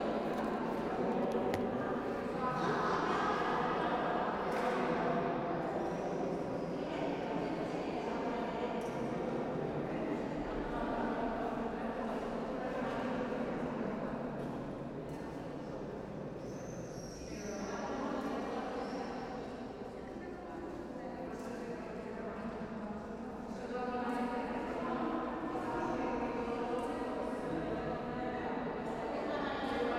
Soundscapes > Indoors
AMB INT walla Iglesia Castillo Peniscola 2506071
Interior ambience inside one of the Peñíscola's Castle rooms, with visitors talking in the distance, inside a really reverberant space. You can hear the outside birds (the door was open). Recorded using the Sony PCM M10 internal mics (no fur). High gain mode. Gain wheel around 3 or 4.